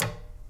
Sound effects > Other mechanisms, engines, machines
Woodshop Foley-016
bam, bang, boom, bop, crackle, foley, fx, knock, little, metal, oneshot, perc, percussion, pop, rustle, sfx, shop, sound, strike, thud, tink, tools, wood